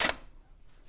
Sound effects > Other mechanisms, engines, machines
metal shop foley -191
foley, knock, crackle, metal, percussion, thud, boom, perc, sound, bang, strike, tink, bop, shop, rustle, bam, fx, pop, little, wood, sfx, oneshot, tools